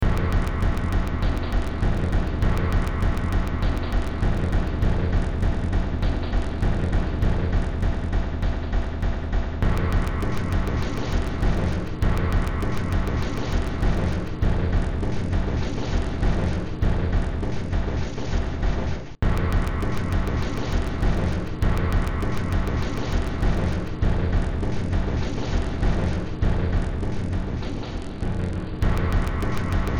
Music > Multiple instruments
Short Track #3175 (Industraumatic)
Horror, Ambient, Cyberpunk, Industrial, Games, Sci-fi, Soundtrack, Underground, Noise